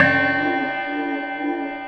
Instrument samples > Other
clockloop 127 bpm
fl studio 9 . a sound sampling